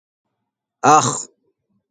Sound effects > Other
In Tajwid and Arabic phonetics, the place where a letter is pronounced is called "makhraj" (مَخْرَج), which refers to the specific point in the mouth or throat where a sound originates. The correct identification of the makhraj is crucial to ensure accurate pronunciation of Arabic letters, especially in the recitation of the Qur'an. In Tajwid and Arabic phonetics, the place where a letter is pronounced is called "makhraj" (مَخْرَج), which refers to the specific point in the mouth or throat where a sound originates. The correct identification of the makhraj is crucial to ensure accurate pronunciation of Arabic letters, especially in the recitation of the Qur'an. This is my own voice. I want to put it as my audio html project.